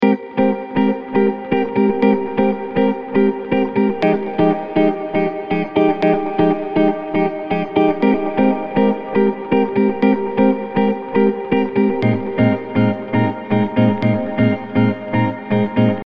Music > Solo instrument
Loop #2 - (Key of Eb Major)
Plucked, Strings